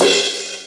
Instrument samples > Percussion
crash Zildjian 16 inches bassized semibrief
I have it in different durations. The "2" files have a warmer attack. tags: Avedis bang China clang clash crack crash crunch cymbal Istanbul low-pitched Meinl metal metallic multi-China multicrash Paiste polycrash Sabian shimmer sinocrash Sinocrash sinocymbal Sinocymbal smash Soultone spock Stagg Zildjian Zultan
Avedis, shimmer, multicrash, metallic, Paiste, Zildjian, cymbal, Meinl, polycrash, Stagg, bang, sinocrash, China, Sabian, multi-China, Soultone, clang, smash, metal, Istanbul, crash, low-pitched, clash, Zultan, sinocymbal, crack, spock, crunch